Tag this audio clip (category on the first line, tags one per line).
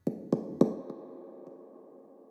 Sound effects > Other
reverb
tapping